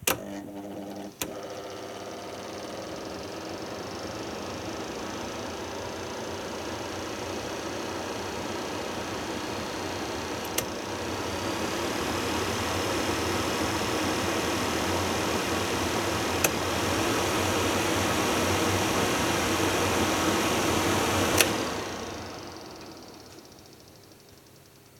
Sound effects > Objects / House appliances
Cooktop fan being turned on at 3 different speeds. Recorded with my phone.